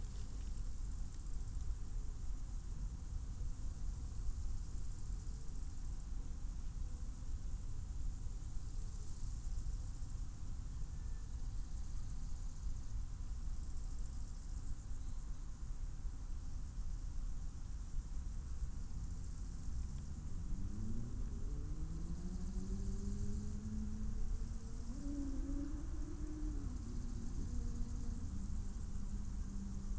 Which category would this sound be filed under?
Soundscapes > Nature